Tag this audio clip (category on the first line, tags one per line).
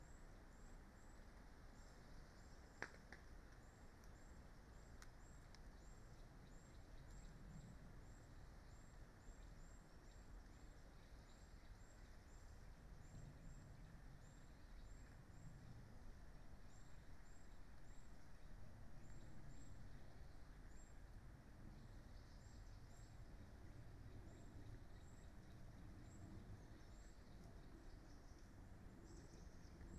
Soundscapes > Nature
soundscape
field-recording
nature
weather-data
alice-holt-forest
modified-soundscape
natural-soundscape
phenological-recording
artistic-intervention
Dendrophone
sound-installation
data-to-sound
raspberry-pi